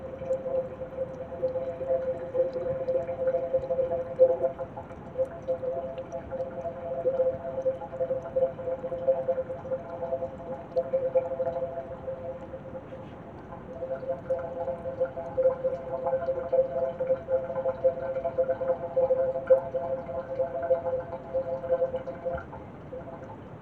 Sound effects > Objects / House appliances
Strange sound coming from a shower drain, sounds kinda scary slowed down. Recorded with my phone.